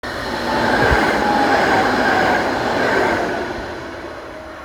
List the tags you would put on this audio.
Soundscapes > Urban
Field-recording Railway Tram

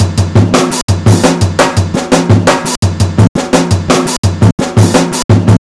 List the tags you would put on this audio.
Music > Other
beat drumloop drums

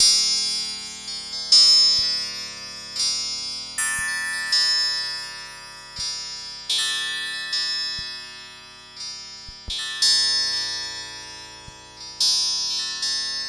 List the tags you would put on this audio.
Soundscapes > Other
decay bells resonating church torso ringing artificial torso-s4 bell resonance

